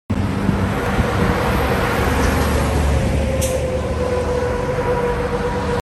Sound effects > Vehicles
Sun Dec 21 2025 (3)
highway, road, truck